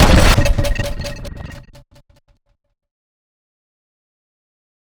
Sound effects > Other
Sound Design Elements Impact SFX PS 110
All samples used in the production of this sound effect are field recordings recorded by me. Recording gear-Tascam Portacapture x8 and Microphone - RØDE NTG5.The samples of various types of impacts recorded by me were layered in Native Instruments Kontakt 8, then the final audio processing was done in REAPER DAW.
blunt, transient, sound, hit, sfx, sharp, hard, rumble